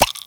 Sound effects > Objects / House appliances
Pill Bottle Cap Open 1
close, drums, medicine